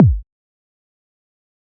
Instrument samples > Percussion
a short and clean electronic kick drum
drum, kick, percussion